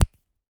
Sound effects > Human sounds and actions
Kid Fingersnap 2
Subject : An adult fingersnapping. Date YMD : 2025 04 20 Location : Gergueil France. Hardware : A Zoom H2n in MS mode. Mid mic only. Processing : Trimmed and Normalized in Audacity.